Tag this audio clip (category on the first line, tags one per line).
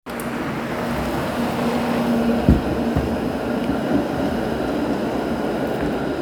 Soundscapes > Urban
Ratikka
Tram
TramInTampere